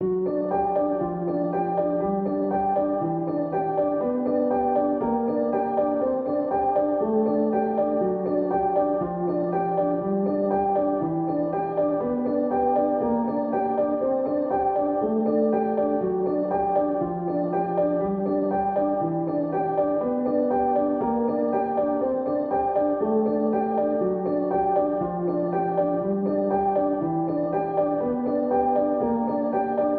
Music > Solo instrument
Piano loops 163 efect 4 octave long loop 120 bpm
120bpm, free, simple, loop, reverb